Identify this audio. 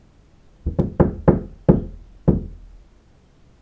Sound effects > Objects / House appliances
Door hinge low cracking (knocking like) 2
Subject : A door hinge making this noise as it swings open narually. As if someone was knocking at it. Date YMD : 2025 04 19 Location : Indoor Gergueil France. Hardware : Tascam FR-AV2, Rode NT5 XY Weather : Processing : Trimmed and Normalized in Audacity.